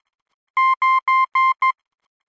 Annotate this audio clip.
Sound effects > Electronic / Design
A series of beeps that denote the number 9 in Morse code. Created using computerized beeps, a short and long one, in Adobe Audition for the purposes of free use.
Language, Morse, Telegragh